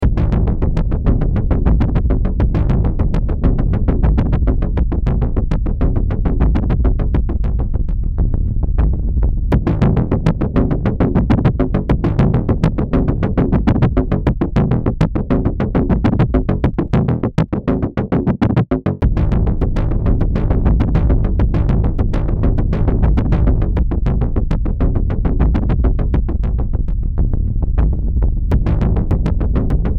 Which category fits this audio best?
Music > Multiple instruments